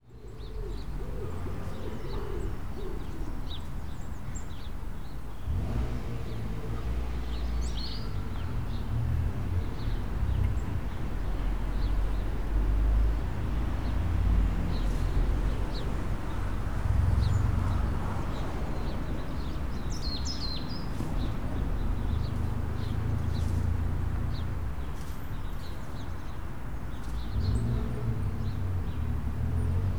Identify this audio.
Soundscapes > Nature
A recording next to a canal. Wombourne, South Staffordshire. Day time.